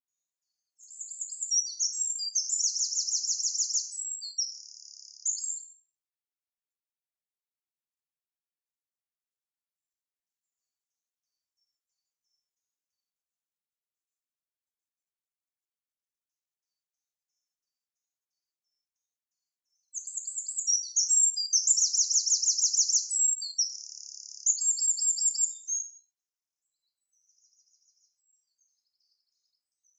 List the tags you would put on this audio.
Sound effects > Natural elements and explosions
birds chirping Field recording singing wren

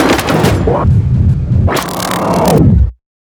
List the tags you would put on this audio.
Sound effects > Other mechanisms, engines, machines
actuators circuitry clicking digital elements mechanical mechanism operation sound

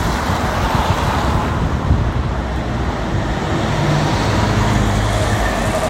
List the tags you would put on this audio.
Soundscapes > Urban
urban bus

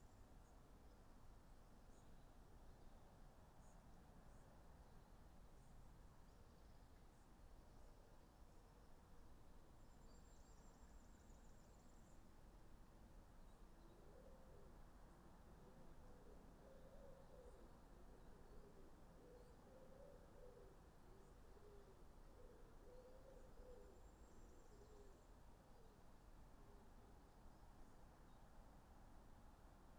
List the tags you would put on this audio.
Soundscapes > Nature
field-recording phenological-recording soundscape natural-soundscape weather-data artistic-intervention modified-soundscape data-to-sound nature alice-holt-forest Dendrophone raspberry-pi sound-installation

